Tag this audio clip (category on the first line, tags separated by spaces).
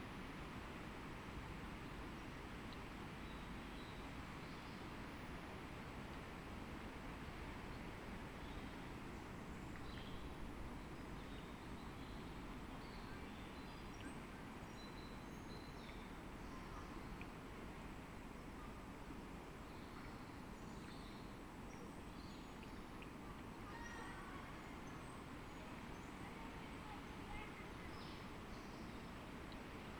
Soundscapes > Nature
data-to-sound sound-installation Dendrophone raspberry-pi modified-soundscape weather-data natural-soundscape alice-holt-forest phenological-recording soundscape artistic-intervention nature field-recording